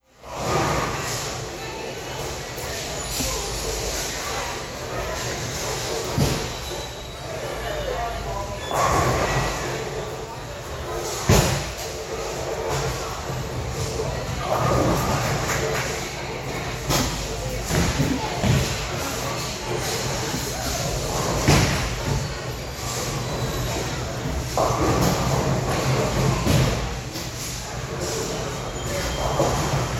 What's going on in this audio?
Soundscapes > Indoors
AMBSprt-Samsung Galaxy Smartphone, CU Bowling Alley, Ball Drops, Pins Crash, Walla Nicholas Judy TDC
A bowling alley ambience - bowling ball drops, pins crash and walla.